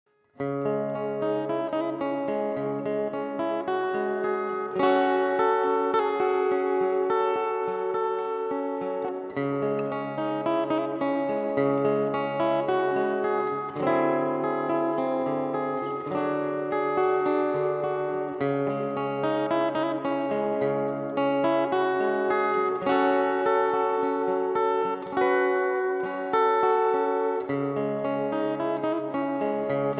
Music > Multiple instruments
a dystopian lonely acoustic guitar chord sequence containing acoustic guitars, bass guitar and synthesizer. Gear Used: Abelton Line 6 Helix Meris Enzo synth tc electronic flashback delay